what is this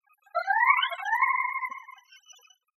Sound effects > Experimental
Short Car Alarm (Stretched Version)
Recorded with Zoom H6 XY-Microphone. Edited with PaulXStretch.
vehicle, alarm, stretch, car, paulstretch, sfx, whistle